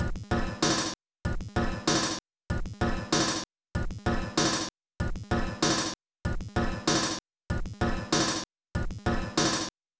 Instrument samples > Percussion
This 192bpm Drum Loop is good for composing Industrial/Electronic/Ambient songs or using as soundtrack to a sci-fi/suspense/horror indie game or short film.
Dark, Underground, Packs, Samples, Weird, Alien, Drum, Loopable, Soundtrack, Ambient, Loop, Industrial